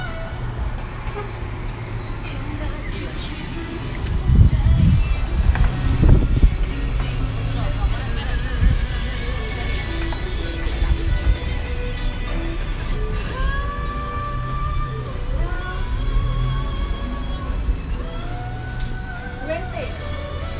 Soundscapes > Urban
Street Ambience, Bangkok, Thailand (Feb 23, 2019)
Recording of a busy street in Bangkok, Thailand, on February 23, 2019. Includes city sounds with vehicles, background music and street chatter.
Bangkok, chatter, city, field, local, recording, soundscape, street, Thailand, urban, vehicles